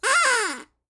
Sound effects > Animals
TOONAnml-Blue Snowball Microphone, CU Duck Whistle, Single Squawk 02 Nicholas Judy TDC
A single duck whistle squawk.